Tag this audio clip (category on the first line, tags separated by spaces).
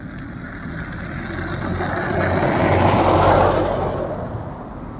Sound effects > Vehicles
vehicle automobile car